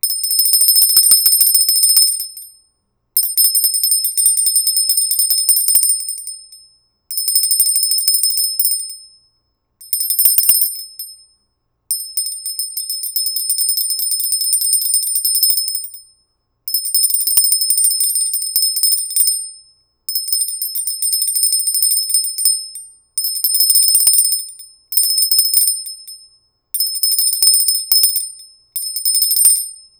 Sound effects > Objects / House appliances
BELLHand-Blue Snowball Microphone, CU Small, Metal, Philidelphia, Ringing Nicholas Judy TDC
A small metal 'Philidelphia' handbell ringing.
bell, Blue-brand, Blue-Snowball, hand, handbell, metal, ring, small